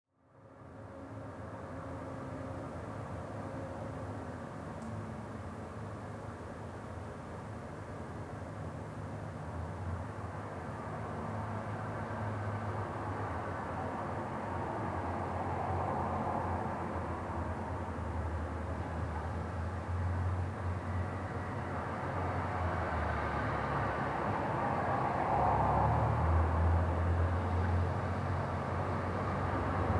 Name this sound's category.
Soundscapes > Urban